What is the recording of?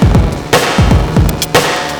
Music > Solo percussion
Industrial Estate 30
120bpm, Ableton, chaos, industrial, loops, soundtrack, techno